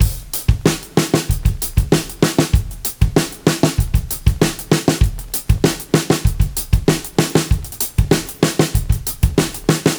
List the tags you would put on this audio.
Music > Solo percussion
Acoustic
Break
Breakbeat
Drum
DrumLoop
Drums
Drum-Set
Dusty
Lo-Fi
Vintage
Vinyl

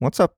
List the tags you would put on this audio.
Speech > Solo speech
2025
Adult
Calm
FR-AV2
Generic-lines
greeting
Hypercardioid
july
Male
mid-20s
MKE-600
MKE600
Sennheiser
Shotgun-mic
Shotgun-microphone
Single-mic-mono
Tascam
VA
Voice-acting
whats-up

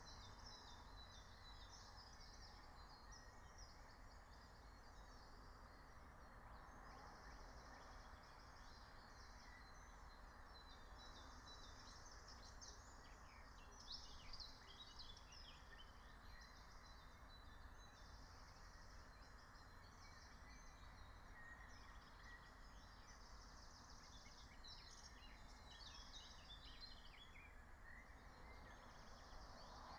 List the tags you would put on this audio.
Soundscapes > Nature
phenological-recording
alice-holt-forest
soundscape
meadow
field-recording
natural-soundscape
raspberry-pi
nature

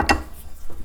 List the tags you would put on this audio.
Sound effects > Other mechanisms, engines, machines
bam
bang
boom
bop
crackle
foley
fx
knock
little
metal
perc
percussion
pop
sfx
shop
sound
thud
tools
wood

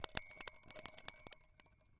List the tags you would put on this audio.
Sound effects > Objects / House appliances
bell
chimes
coins
fairy
jingle
magic
metalic
ring
sparkle

